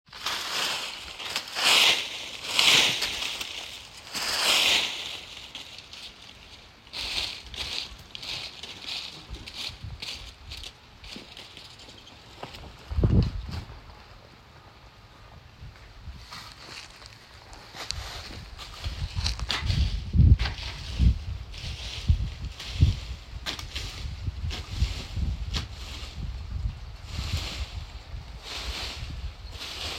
Soundscapes > Nature
Raking katalpa leaves 11/16/2023
Raking katalpa leaves
raking, nature, leaves